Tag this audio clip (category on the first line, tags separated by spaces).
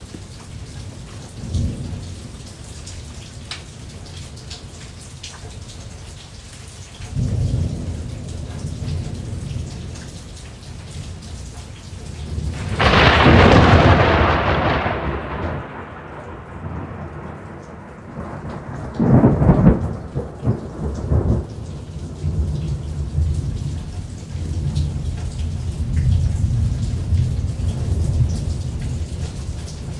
Sound effects > Natural elements and explosions
bolt lightning lightning-bolt rain rainstorm storm thunder thunderstorm weather